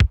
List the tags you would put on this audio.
Instrument samples > Percussion
80s percussion kick analog

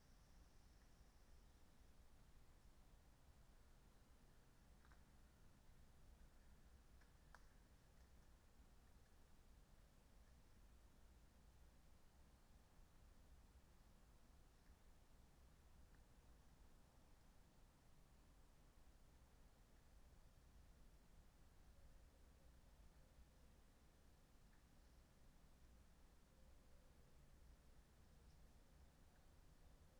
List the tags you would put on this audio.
Soundscapes > Nature
alice-holt-forest raspberry-pi Dendrophone phenological-recording data-to-sound field-recording artistic-intervention natural-soundscape sound-installation modified-soundscape soundscape nature weather-data

